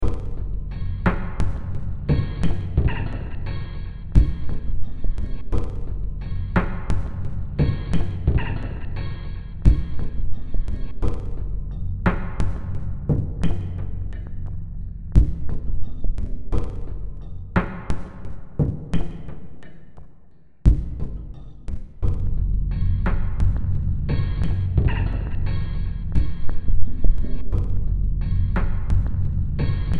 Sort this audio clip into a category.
Music > Multiple instruments